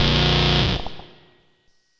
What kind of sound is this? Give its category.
Instrument samples > Synths / Electronic